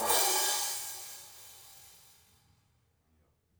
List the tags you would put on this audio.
Music > Solo percussion
cymbals cymbal set crash

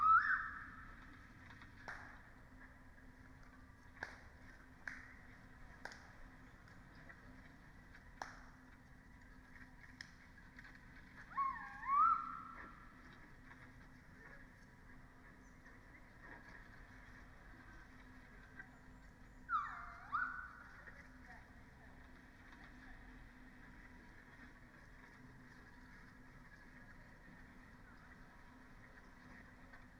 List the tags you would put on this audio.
Soundscapes > Nature
raspberry-pi sound-installation natural-soundscape artistic-intervention phenological-recording data-to-sound modified-soundscape nature weather-data Dendrophone alice-holt-forest field-recording soundscape

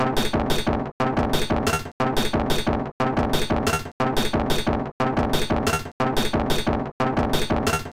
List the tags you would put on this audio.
Instrument samples > Percussion
Ambient Packs Weird Samples Alien Loopable Industrial Dark Drum Loop Underground Soundtrack

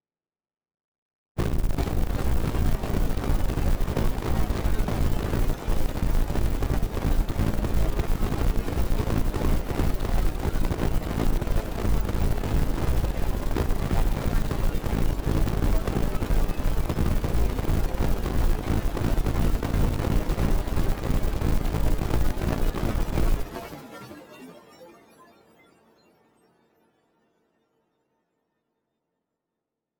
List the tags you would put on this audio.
Music > Solo percussion
Bass-and-Snare Bass-Drum Experimental Experiments-on-Drum-Beats Four-Over-Four-Pattern FX-Laden FX-Laden-Simple-Drum-Pattern Interesting-Results Silly

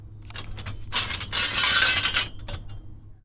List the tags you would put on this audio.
Sound effects > Objects / House appliances
Ambience Atmosphere Bang Bash Clang Clank Dump dumping dumpster Environment Foley FX garbage Junk Junkyard Machine Metal Metallic Perc Percussion rattle Robot Robotic rubbish scrape SFX Smash trash tube waste

